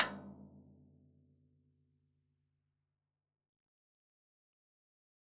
Music > Solo percussion
Floor Tom Oneshot -023 - 16 by 16 inch
beatloop, velocity, tom, kit, percussion, toms, beats, flam, beat, studio, rim